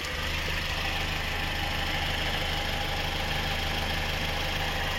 Sound effects > Other mechanisms, engines, machines
clip auto (15)
Toyota, Avensis, Auto